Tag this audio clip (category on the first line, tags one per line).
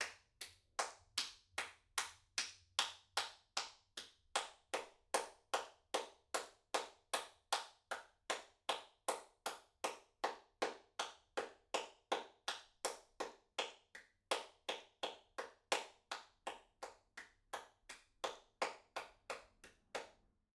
Sound effects > Human sounds and actions
Applaud
Applauding
Applause
AV2
clap
clapping
FR-AV2
individual
indoor
NT5
person
Rode
solo
Solo-crowd
Tascam
XY